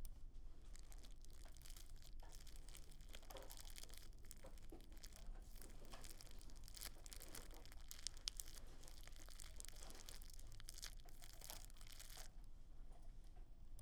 Sound effects > Other
Wrapping something in a plastic bag/ziplock